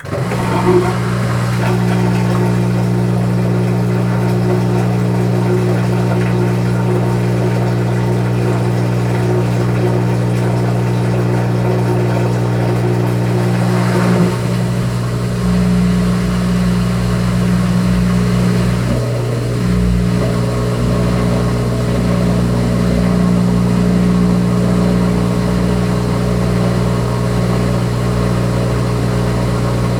Sound effects > Objects / House appliances
Washer Machine 01
I placed my Zoom H4N multitrack recorder on a surface near my washer machine while it was operating. Then used Audacity to normalize the audio. What is heard in this upload are the results.